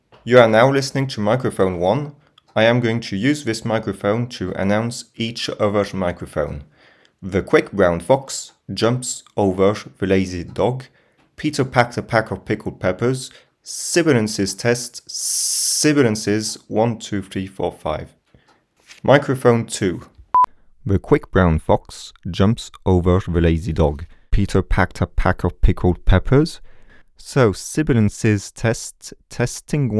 Speech > Other
Blind mic testing collection
A collection of me testing different microphones. I have recorded 5min sessions with each mic which I'l probably upload.
AKG, beyerdynamic, blind-test, comparison, EV, FR-AV2, mic, microphone, microphone-testing, Neumann, Rode, Royer, SE, sennheiser, Shure, Syperlux, Tascam, T-bone, test, Warm-Audio, Zoom